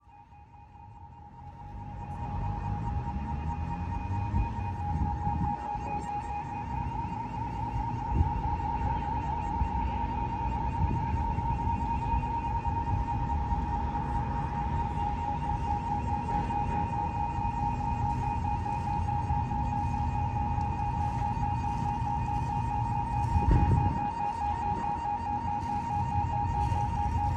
Sound effects > Other mechanisms, engines, machines
Alarm for bridge opening
Alarm sounding as lock in floating harbour opens.
siren, bridge, field-recording, Alarm